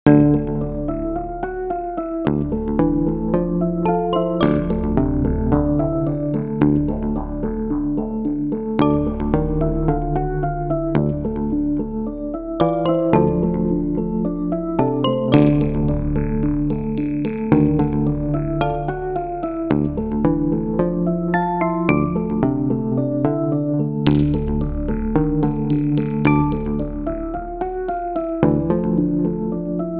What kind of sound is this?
Music > Multiple instruments
Tweaker Pad Pluck Melody Loop in D and A 110bpm
a kooky lil melody loop of pads and plucks and bass created in FL Studio
ambient, bass, chill, circus, composition, dance, electronic, funky, groovy, key, keyloop, loop, loopable, melody, music, pad, perc, pluck, smooth, synth, techno, top